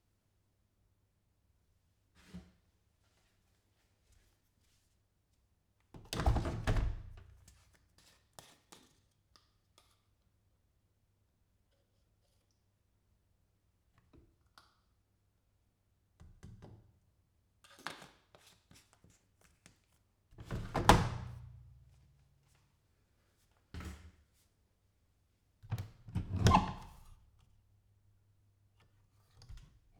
Sound effects > Objects / House appliances
Washing machine HighOne 15min L-MKE600 front R-NT5 Rear
Subject : A15min cycle of my washing machine, a "wf 580 d w701t" by Highone. Date YMD : 2025 July 23 Location : Albi 81000 Tarn Occitanie France. Sennheiser MKE600 P48, no filter (1m infront of the washing machine). And a Rode NT5 placed at the back (top left side, if viewed from the front.) Weather : Processing : Trimmed and normalised in Audacity.